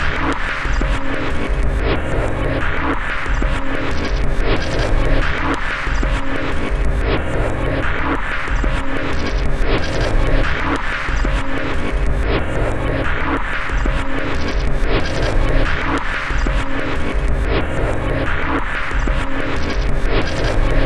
Instrument samples > Percussion
This 92bpm Drum Loop is good for composing Industrial/Electronic/Ambient songs or using as soundtrack to a sci-fi/suspense/horror indie game or short film.
Underground, Drum, Loopable, Soundtrack, Samples, Industrial, Packs, Loop, Weird, Dark, Ambient, Alien